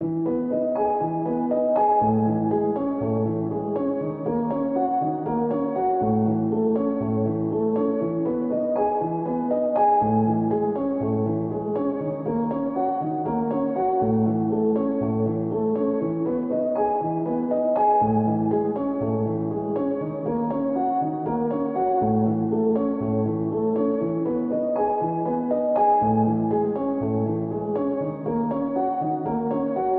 Music > Solo instrument
Piano loops 191 efect 4 octave long loop 120 bpm
120, 120bpm, free, loop, music, piano, pianomusic, reverb, samples, simple, simplesamples